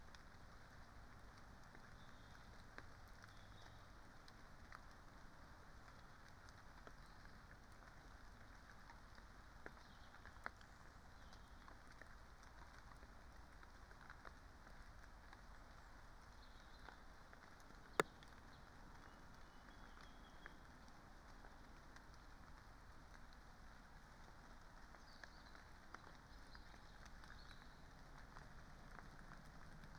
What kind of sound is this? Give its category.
Soundscapes > Nature